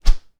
Sound effects > Objects / House appliances
Whoosh - Plastic Hanger 2 (middle clip) 3
Subject : Whoosh from a plastic clothe hanger. With clips adjustable across the width of it. I recorded whooshes with the clip on the outer edge and near the center hanger. Middle clip here refers to being closest to the middle of the hanger. Date YMD : 2025 04 21 Location : Gergueil France. Hardware : Tascam FR-AV2, Rode NT5 pointing up and towards me. Weather : Processing : Trimmed and Normalized in Audacity. Probably some fade in/out.
Airy, coat-hanger, Fast, FR-AV2, Hanger, NT5, Plastic, Rode, SFX, swing, swinging, Tascam, Transition, Whooosh, Whoosh, Woosh, wosh